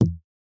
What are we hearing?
Sound effects > Objects / House appliances
Chains Trigger 1 Tone
Triggering a stretched chain necklace like a string, recorded with an AKG C414 XLII microphone.
Chain, Jewellery, Necklace